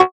Instrument samples > Synths / Electronic
TAXXONLEAD 8 Gb
fm-synthesis, additive-synthesis, bass